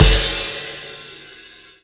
Instrument samples > Percussion
crashkick XWR 11
crash, shimmer, multicrash, Zultan, sinocrash, spock, Soultone, Sabian, clang, multi-China, low-pitched, Stagg, smash, China, Zildjian, cymbal, bang, Paiste, polycrash, crack, Meinl, sinocymbal, clash, crunch, Istanbul, metallic, metal, Avedis